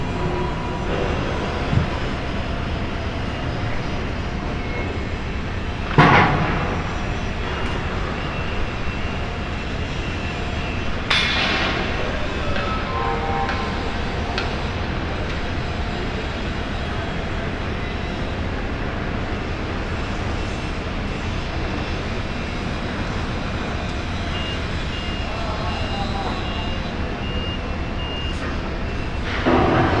Soundscapes > Urban
Field recording captured from the window of a cruise ship under construction at the Saint-Nazaire shipyard, France. The soundscape features the layered rhythm of industrial work — forklift engines, metallic clangs, saws cutting through steel, faint shouts and footsteps echoing through the dock. It’s a portrait of a working port: mechanical, alive, and constantly shifting. The perspective from inside the ship adds a natural acoustic filter, softening some sounds while amplifying others; a subtle sense of distance and space. Ideal for use in sound design, documentaries, industrial ambiences, or as textural background for installation work. No processing or EQ applied.